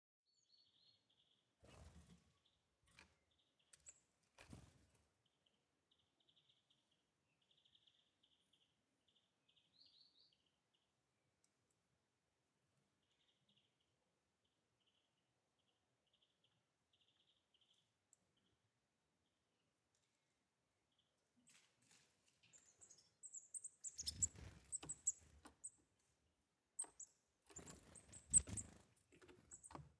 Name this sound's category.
Soundscapes > Nature